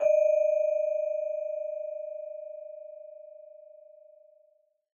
Sound effects > Electronic / Design
MULTIMEDIA INNOVATIVE CRASH TONE
BEEP, BOOP, COMPUTER, DING, HIT, SYNTHETIC